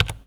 Sound effects > Objects / House appliances
Subject : A all white FUJITSU keyboard key being pressed. Date YMD : 2025 03 29 Location : Thuir Theatre, South of France. Hardware : Zoom H2N, MS mode. Using the middle side only. Handheld. Weather : Processing : Trimmed and Normalized in Audacity.